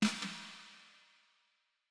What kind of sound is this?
Music > Solo percussion
Snare Processed - Oneshot 26 - 14 by 6.5 inch Brass Ludwig
fx, drumkit, percussion, snareroll, realdrum, drums, rimshot, oneshot, hits, reverb, kit, roll, flam, rimshots, drum, snares, snaredrum, ludwig, hit, acoustic, perc, processed, sfx, realdrums, snare, rim, crack, brass, beat